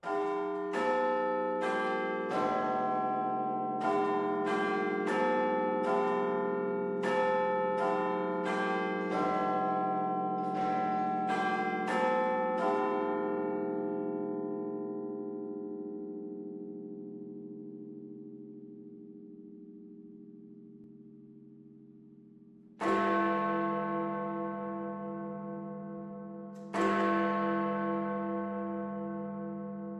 Sound effects > Other
Big Ben Strikes 11 o'clock on Remembrance Sunday - November 9th 2025